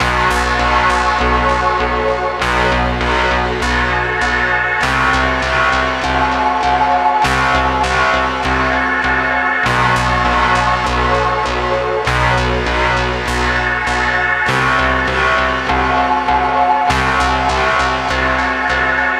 Music > Solo instrument
100 D SK1Polivoks 03
Melodic loops made with Polivoks and Casio SK1 analogue synths
80s, Analog, Analogue, Brute, Casio, Electronic, Loop, Melody, Polivoks, Soviet, Synth, Texture, Vintage